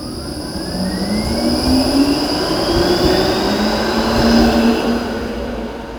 Sound effects > Vehicles
A tram leaving in Tampere, Finland. Recorded with OnePlus Nord 4.